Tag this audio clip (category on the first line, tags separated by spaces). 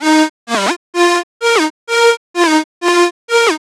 Music > Solo instrument
Melody Electro Lead Loop House EDM Dance Progressie-House